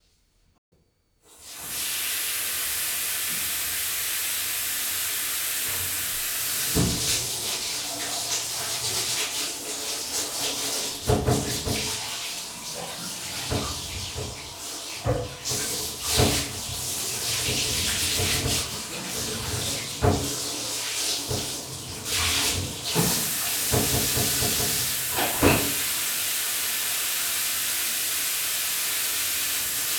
Soundscapes > Indoors
You can hear someone running a bath, that is, filling a bathtub with water. Microphones were placed in the hallway outside the bathroom to capture more ambient sound, and only one microphone was placed directly in the bathroom. However, the single tracks of this are also available.